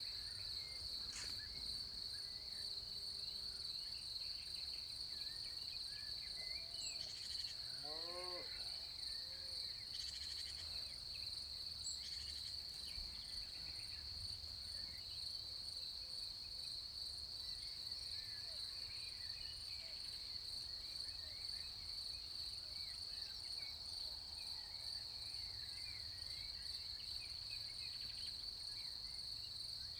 Soundscapes > Nature
orthoptères StLéger Soir
Orhtoptera singing in the evening in a cows' pasture. St-Léger, Bourgogne, France EM272 mics in AB Stereo
crickets, field-recording, night, orthoptera